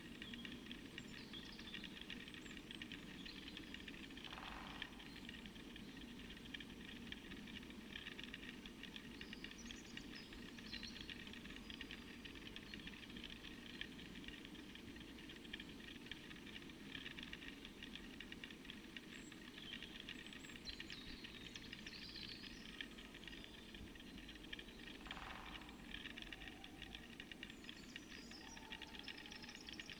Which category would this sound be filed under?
Soundscapes > Nature